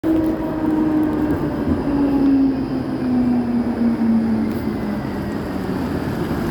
Sound effects > Vehicles
18tram tostoptown
A tram is slowing doiwn speed as it is approaching a stop. Recorded in Tampere with a Samsung phone.
public-transport, traffic, tram